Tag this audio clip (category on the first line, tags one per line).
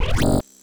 Sound effects > Electronic / Design
glitch
digital
one-shot
pitched
stutter
hard